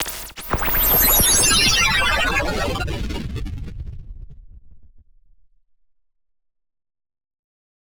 Sound effects > Experimental
SFX DigitalRocketflare CS Reface-02.
Sci-fi flare with rocket-like burst and pitch modulation.
artifact, ufo, digital, fx, weird, SFX, scifi, noise, robotic, abstract, sci-fi, future, sounddesign